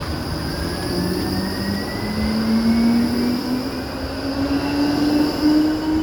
Sound effects > Vehicles
tram-samsung-7
Recording of a tram (Skoda ForCity Smart Artic X34) near a roundabout in Hervanta, Tampere, Finland. Recorded with a Samsung Galaxy S21.
vehicle, tramway, tram, outside